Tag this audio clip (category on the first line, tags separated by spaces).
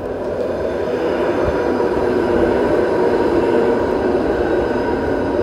Soundscapes > Urban
tampere tram vehicle